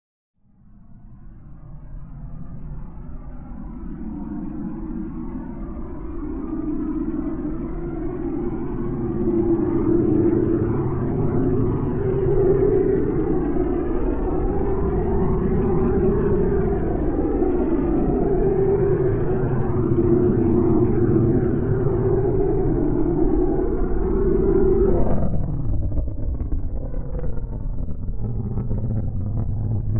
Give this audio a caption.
Sound effects > Electronic / Design
Using Ableton's 'Roar' to generate a rocket sound with sonic booms